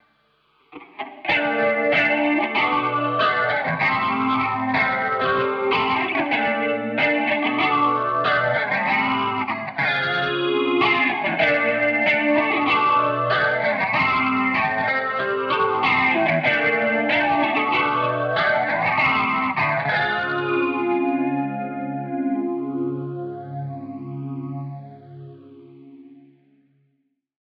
Music > Solo instrument
Dream Pop Shoegaze Guitar 190bpm Riff2
Here is a dreamy guitar sample that I record in my home studio having fun with a few guitar toys Guitars: Jazzmaster Fender Mexico, Faim Stratocaster (Argentina) pedalboard: Behringer graphic eq700 Cluster mask5 Nux Horse man Fugu3 Dédalo Electro Harmonix Keys9 Maquina del tiempo Dédalo Shimverb Mooer Larm Efectos Reverb Alu9 Dédalo Boss Phase Shifter Mvave cube baby 🔥This sample is free🔥👽 If you enjoy my work, consider showing your support by grabbing me a coffee (or two)!
ambient, atmosphere, deep, dreampop, guitar, jazzy, shoegaze